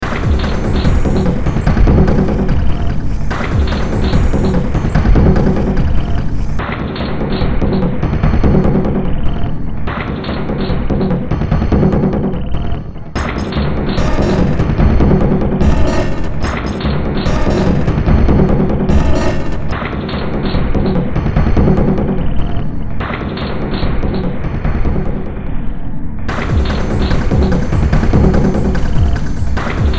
Music > Multiple instruments
Short Track #3243 (Industraumatic)
Games, Cyberpunk